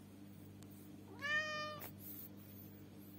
Sound effects > Animals
neow sound (2)
meow, meowing, cat